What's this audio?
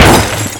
Instrument samples > Percussion
car crash short 1
accident, aliendrum, alienware, bang, break, breaking, broken, car, car-crash, carcrash, cinematic, collision, crash, death-metal, effect, engine, fender-bender, glass, motorway, pile-up, recording, road, smash-up, sound, weirddrum, wreck